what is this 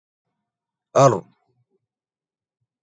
Sound effects > Other
ro-sisme
In Tajwid and Arabic phonetics, the place where a letter is pronounced is called "makhraj" (مَخْرَج), which refers to the specific point in the mouth or throat where a sound originates. The correct identification of the makhraj is crucial to ensure accurate pronunciation of Arabic letters, especially in the recitation of the Qur'an. This is my own voice. I want to put it as my audio html project.